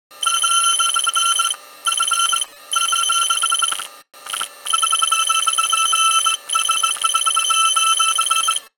Sound effects > Electronic / Design
UIMisc Onboard Computer, Starfighter Computer Idle
Idling starship computer beeps and bleeps made with sampletoy iOS app. mixed and mastered in ableton.
alert, comms, communication, computer, film, game, notification, scifi, space, starship, synth, UCS, UI